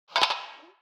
Sound effects > Human sounds and actions
metal footstep sample1
Footsep sound effect. <3
step, footstep, feet, steps, walk, foot, footsteps, walking